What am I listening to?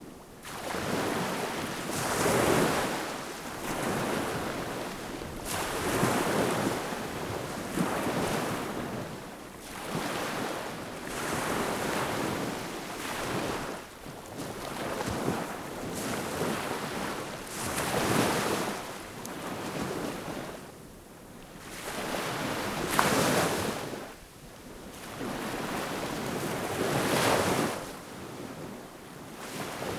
Soundscapes > Nature
Sea waves - Beach
Sea waves crashing on the shore. Recorded with a Zoom H1essential
shore, coast, beach, waves, sea, ocean, seaside